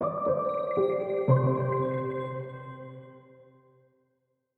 Music > Other
Minimalist news transition
A short, rather positive musical phrase for a transition, as in the TV news. The vibe is gentle, soft. Stinger ideal for news, report, commercial or infographics. The rythm is at 118bpm
transition, jingle, happy, stinger, report, television, transitional